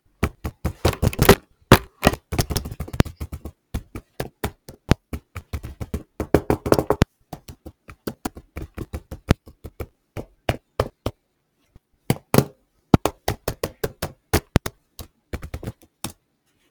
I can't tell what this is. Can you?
Sound effects > Objects / House appliances
banging on my keyboard smash
bang, keyboard, smash